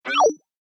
Sound effects > Electronic / Design
Robot Cry UI
Sounds like a Robot crying (again). Also made in Ableton's Operator.